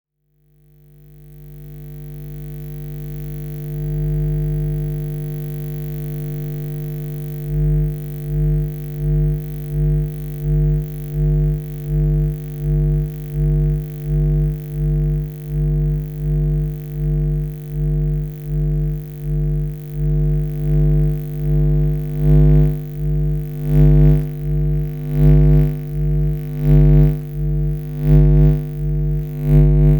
Sound effects > Other mechanisms, engines, machines
Sonido magnetico semaforo estacion de control Montevideo
The artist captures the electromagnetic sound of a trafic light control box in the old city of Montevideo. Recorded with a homemade electromagnetic microphone. plunger in a basement with reverb.